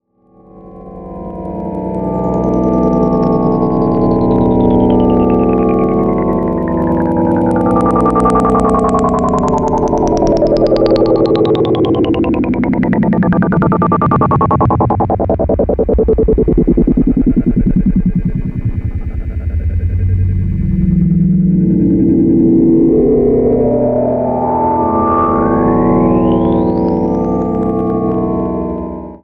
Soundscapes > Synthetic / Artificial
All sounds sourced from this event are original recordings made by the participants or organizers (no uncleared samples).